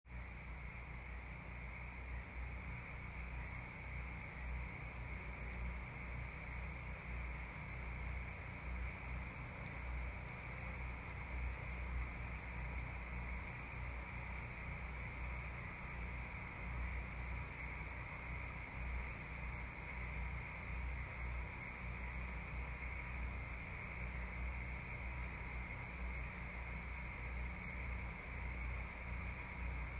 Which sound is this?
Soundscapes > Nature
Frogs & generators 02/05/2024
Sound of frogs and generators , power down in the town
night, frogs, generators